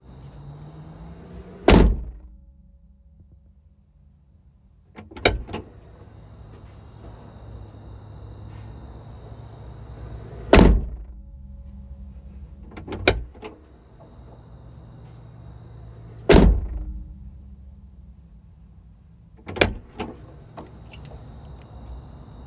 Sound effects > Vehicles
Jeep Wangler Back Hatch Tailgate Door
The back hatch or tailgate on a Jeep Wrangler Sahara, closed and opened a few times.
automobile, car, door, hatch, metal, vehicle